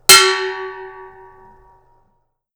Sound effects > Objects / House appliances
METLImpt-Blue Snowball Microphone Metal, Clang 04 Nicholas Judy TDC
A metal clang.
Blue-brand, Blue-Snowball, clang, impact, metal